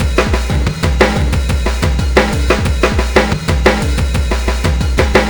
Music > Other
Pause HK-1 (remix) 181 bpm
break, breakbeat, drumloop, groovy, improvised, percs, percussion-loop, quantized